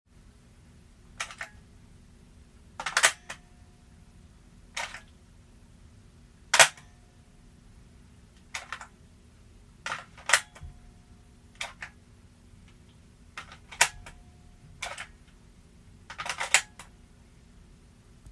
Sound effects > Other
Recorded from Airsoft Rifle Great for Action movies, Horror movies, Cartoons, etc.